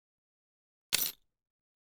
Sound effects > Objects / House appliances
Llaves / dropping the keys to the ground
house, keys, metal